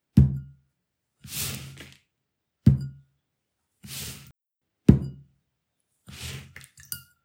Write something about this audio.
Sound effects > Objects / House appliances
Glass - Set and place
Place a glass on the table and move it forward on a napkin. * No background noise. * No reverb nor echo. * Clean sound, close range. Recorded with Iphone or Thomann micro t.bone SC 420.
bell, celebration, champagne, chic, classy, cling, french, posh, tchin-tchin, toast, wed, wine